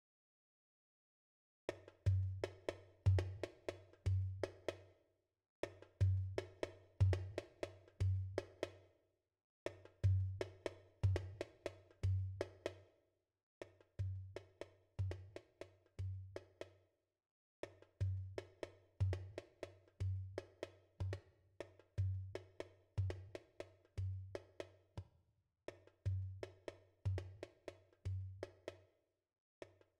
Music > Solo percussion
percussion loop 120BPM
Tabla loop in 120bpm
Indian-percussion, percussive, Tabla